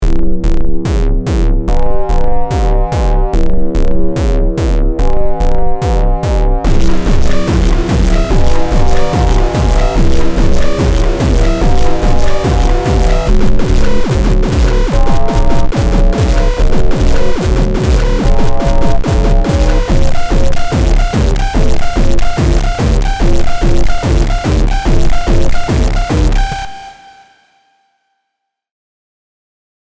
Music > Multiple instruments
Bit Crunched
A crunchy beat. All original beats, made using Battery and FM8, 150bpm.